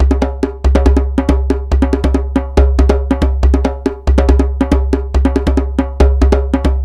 Music > Other
djembe 140 bpm
FL studio 9 + kit djembe pattern construction